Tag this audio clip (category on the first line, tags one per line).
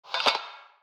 Sound effects > Human sounds and actions
walk
walking
footstep
metalic
step
footsteps
steps
foot
feet